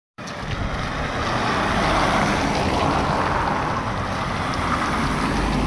Soundscapes > Urban
car sound 9
Car with studded tires recorded on phone